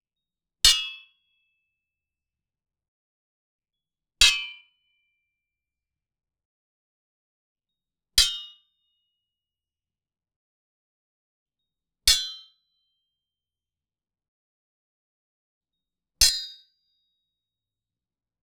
Sound effects > Objects / House appliances
silverware sai hits w ringouts designed low pitched sounds 02202025
custom sound of sais clash against swords inspired by tmnt 2012 episode 4.